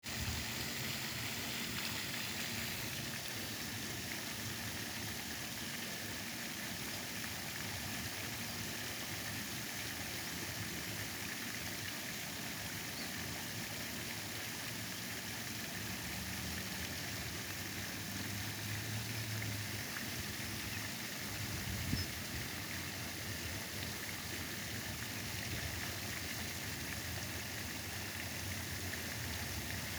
Soundscapes > Urban
by the west fountain of Glen Lake in Pitman, New Jersey 2025 05 13-12 27 37
Recorded in mid-May 2025 by the west fountain of Glen Lake in Pitman, New Jersey.
field-recording, suburban